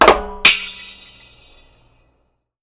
Music > Solo percussion
MUSCPerc-Blue Snowball Microphone, CU Desktop Drums, Rimshot Nicholas Judy TDC
A desktop drum rimshot.
Blue-brand, rimshot, desktop, drum, Blue-Snowball, drums, comedy